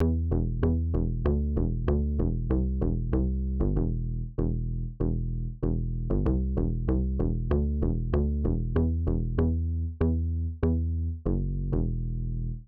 Music > Solo instrument
192bpm - BajoBass GMajor - Master
Good Modern Bass. Bass only. Can be mix with KampanaMelody.
Bass, Loop, Master, Synth